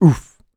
Sound effects > Human sounds and actions
Hurt - Oof
dialogue, FR-AV2, Human, Hurt, Male, Man, Mid-20s, Neumann, NPC, oneshot, pain, singletake, Single-take, talk, Tascam, U67, Video-game, Vocal, voice, Voice-acting